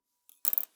Sound effects > Objects / House appliances
Coin Foley 7
change, coin, coins, foley, fx, jingle, jostle, perc, percusion, sfx, tap